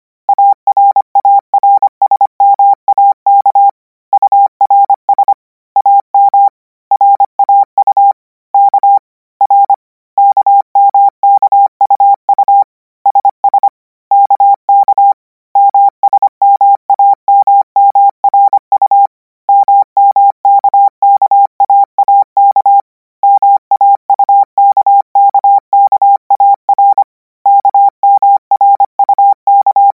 Sound effects > Electronic / Design
Practice hear characters 'KMRSUA' use Koch method (after can hear charaters correct 90%, add 1 new character), 320 word random length, 25 word/minute, 800 Hz, 90% volume.